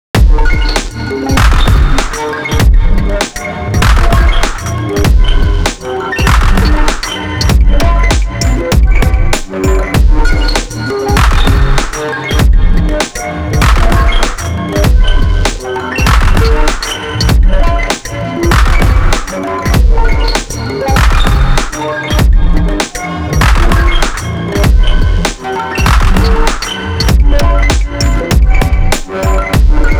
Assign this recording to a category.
Music > Multiple instruments